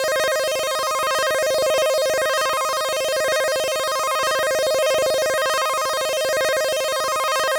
Sound effects > Electronic / Design
Clip sound loops 6
game, 8-bit, fx, clip